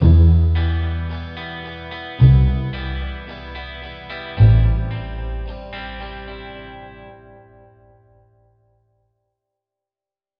Music > Solo instrument
Have fun using it! It's a small music piece made with Garage Band. Maybe usefull for some ambient music. I'd be happy if you tagged me but it isn't mandatory.